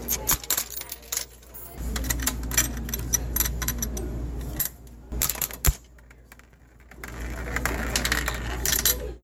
Sound effects > Other mechanisms, engines, machines
MACHMisc-Samsung Galaxy Smartphone, CU Gumball Machine, Put Quarter, Turn Handle, Gumball Slides Nicholas Judy TDC
Putting a quarter into the gumball machine, turning handle and gumball slides out of the machine.
gumball, gumball-machine, handle, Phone-recording, quarter, roll, slide, turn